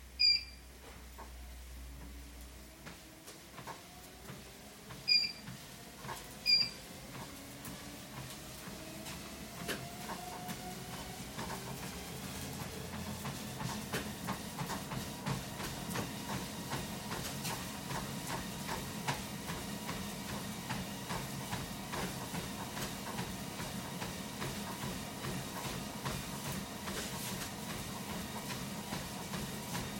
Sound effects > Other mechanisms, engines, machines
A person starting a treadmill and running on it. Recorded with iPhone internal mic.